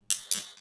Sound effects > Other
spell lightning c
19 - Strong Lightning Spells Foleyed with a H6 Zoom Recorder, edited in ProTools
spell, lightning